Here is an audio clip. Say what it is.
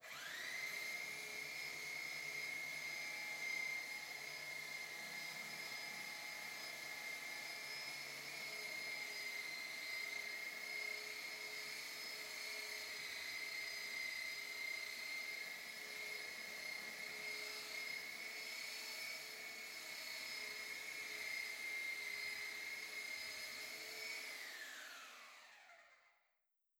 Sound effects > Objects / House appliances
TOOLPowr-Distant Sander Sanding Nicholas Judy TDC
A sander sanding in distance.
ambience, distance, sand, sander